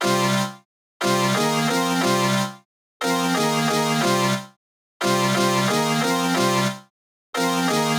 Music > Solo instrument
Ambient 2 C-D#-F Key 90 bpm
This melody just used a sound ''Ambient 2 C-G-F'' in a sample pack I made. I just put it in to sampler to write some notes to play it. Enjoy.